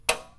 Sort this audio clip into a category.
Sound effects > Other mechanisms, engines, machines